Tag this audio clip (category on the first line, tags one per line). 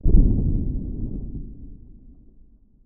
Sound effects > Electronic / Design
Bubble
Splash
Swish
Swiming
Water